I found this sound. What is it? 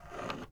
Sound effects > Other mechanisms, engines, machines
gun handle 3
Designed foley sound for less aggressive gun pickup from wooden table, with additional scrapes.
gun, handgun, handle, scrape, soft, table, wood